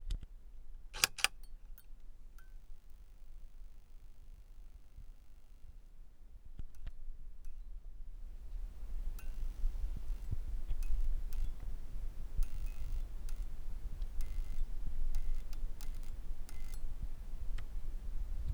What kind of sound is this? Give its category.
Sound effects > Objects / House appliances